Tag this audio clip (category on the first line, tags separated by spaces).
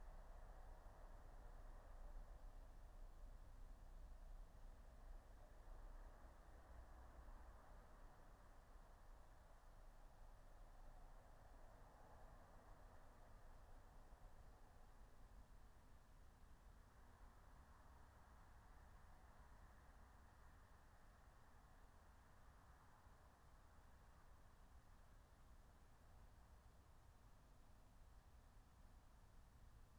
Soundscapes > Nature
phenological-recording meadow field-recording soundscape alice-holt-forest nature natural-soundscape raspberry-pi